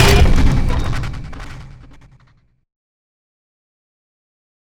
Sound effects > Other
Sound Design Elements Impact SFX PS 109
All samples used in the production of this sound effect are field recordings recorded by me. Recording gear-Tascam Portacapture x8 and Microphone - RØDE NTG5.The samples of various types of impacts recorded by me were layered in Native Instruments Kontakt 8, then the final audio processing was done in REAPER DAW.